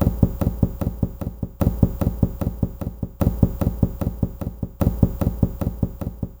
Instrument samples > Percussion
This 150bpm Drum Loop is good for composing Industrial/Electronic/Ambient songs or using as soundtrack to a sci-fi/suspense/horror indie game or short film.
Alien, Ambient, Dark, Drum, Industrial, Loop, Loopable, Packs, Samples, Soundtrack, Underground, Weird